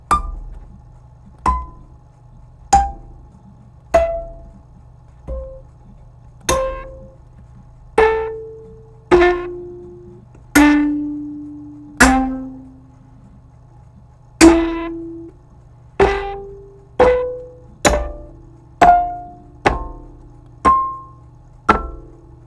Music > Solo percussion
MUSCTnprc-Blue Snowball Microphone, CU Kalimba, Notes Nicholas Judy TDC
note, kalimba, Blue-brand